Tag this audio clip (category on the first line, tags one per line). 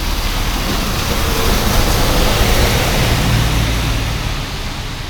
Sound effects > Vehicles
bus
transportation
vehicle